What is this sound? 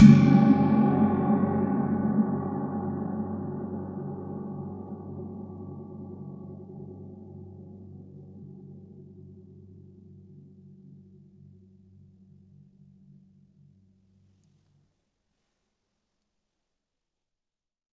Music > Solo instrument
Gong Cymbal -002
Crash, Custom, Cymbal, Cymbals, Drum, Drums, FX, GONG, Hat, Kit, Metal, Oneshot, Paiste, Perc, Percussion, Ride, Sabian